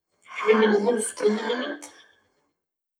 Speech > Processed / Synthetic
Recorded "Are you scared?" and distorted with different effects.